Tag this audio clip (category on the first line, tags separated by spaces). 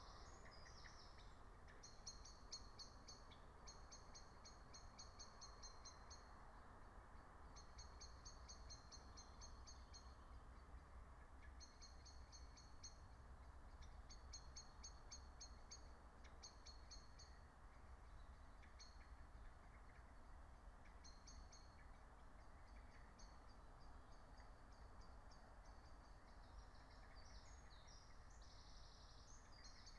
Soundscapes > Nature
soundscape raspberry-pi field-recording alice-holt-forest meadow nature phenological-recording natural-soundscape